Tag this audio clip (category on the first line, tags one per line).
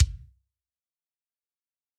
Instrument samples > Percussion
drumkit
drums
kick
kickdrum
sample
trigger